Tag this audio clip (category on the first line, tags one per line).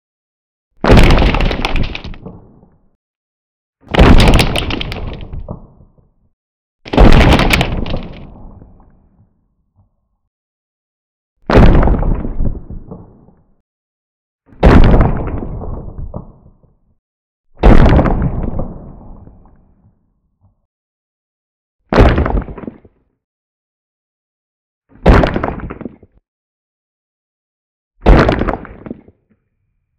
Sound effects > Natural elements and explosions
smash
elemental
magic
explosion
crack
boulder
boom
element
stone
natural
fate
rock
rocks
rumble
destruction
concrete
debris
earth
booming
explode
explosive
designed
quarry
earthbending
elements
anime
avatar